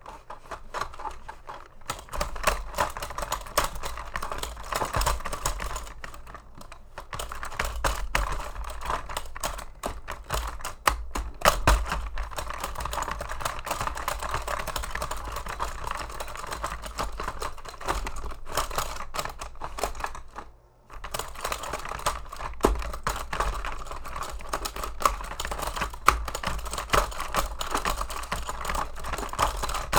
Sound effects > Objects / House appliances
TOYMisc-Blue Snowball Microphone, CU Rock Em' Sock Em' Robots, Being Played Nicholas Judy TDC
Rock Em' Sock Em' Robots being played.
Blue-brand, Blue-Snowball, foley, play, rock-em-sock-em-robots, toy